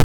Sound effects > Electronic / Design
another crusty thing
made in openmpt and furnace
crust, satisfying